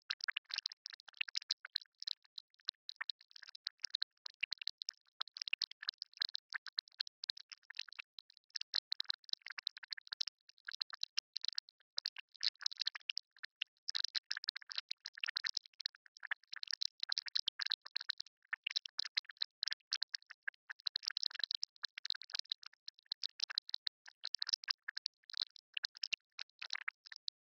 Sound effects > Electronic / Design
ROS-Saliva Texture2
Effect, Botanica, Texture, Organic